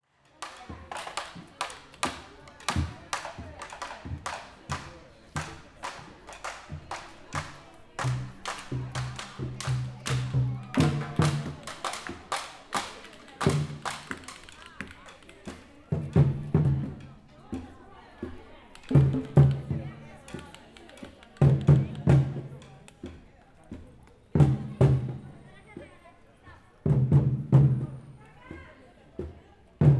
Music > Multiple instruments
Ensayo de comparsa de mujeres de candombe La Melaza
Its rhythmic drumbeats fill the streets of Montevideo's Sur and Palermo neighborhoods, especially during the "Llamadas" parades. Drums played by women group "La Melaza". Their voices can also be heard. A ritual in the middle of the streets of Montevideo. Candombe is a vibrant cultural and musical tradition with African roots, recognized as Intangible Cultural Heritage of Humanity by UNESCO.
percussion, field-recording, candombe, streets, latin-america, women, uruguay, PERCUSIVE